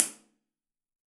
Sound effects > Other
Shower speaker IR
Subject : A IR from deconvoluted sine sweep. A rode NT5o positioned in the shower with a bluetooth speaker placed on the soap holder as if one was listening to music in the shower :) Date YMD : 2025 December Location : Hardware : Tascam FR-AV2 Weather : Processing : Trimmed and normalised in Audacity. Notes : Tips : This is intended to be used with a convolution plugin.